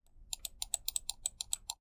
Sound effects > Electronic / Design
the clicking of a computer mouse
clicking, computer, mouse